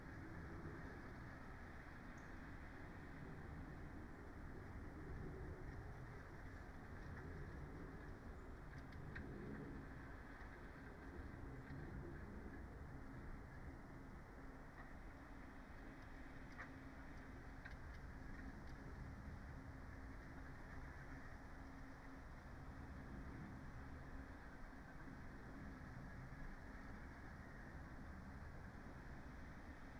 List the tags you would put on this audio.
Soundscapes > Nature
alice-holt-forest
artistic-intervention
data-to-sound
Dendrophone
field-recording
modified-soundscape
natural-soundscape
nature
phenological-recording
sound-installation
soundscape
weather-data

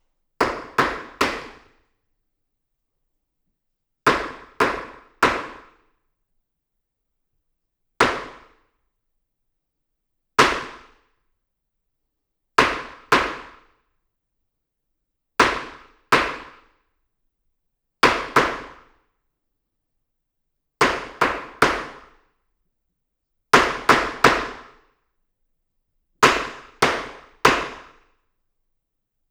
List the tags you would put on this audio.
Sound effects > Other
gavel
hammer
judge
mallet

